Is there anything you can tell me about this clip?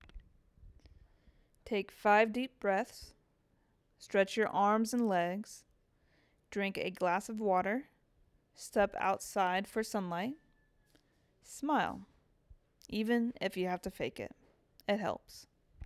Speech > Solo speech
How to Boost Your Mood in 5 Minutes
A quick, uplifting how-to for improving your mood fast. Great for wellness, mental health, or motivational content. Script: "Take five deep breaths. Stretch your arms and legs. Drink a glass of water. Step outside for sunlight. Smile — even if you fake it, it helps!"
HowTo, MentalHealthMatters, LifeSkills, PositiveVibes, BoostYourMood, SelfCareTips, FeelGood